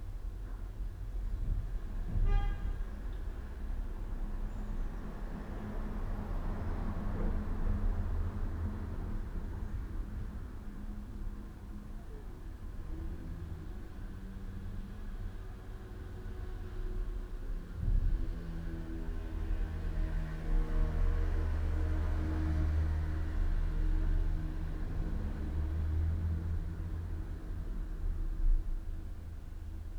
Soundscapes > Indoors
Here's one of them :) Recorded indoors on a Tascam FR-AV2 with rode nt5 in a XY configuration.